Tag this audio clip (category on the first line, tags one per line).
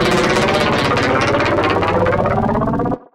Instrument samples > Synths / Electronic
bassdrop
subbass
stabs
subwoofer
clear
low
lfo
wobble
subs
wavetable
lowend
drops
synthbass
bass
synth
sub